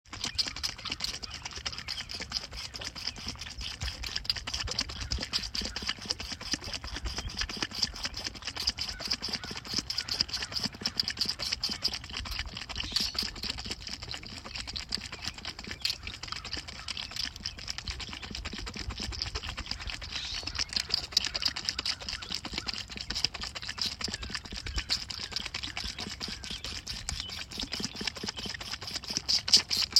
Soundscapes > Nature
Charlie the sheep morning ambience 03/17/2023
Charlie the sheep morning ambienceCharlie the sheep morning ambience
field-recordings, countryside, country, sheep, farmland, homested, farm, morning-ambience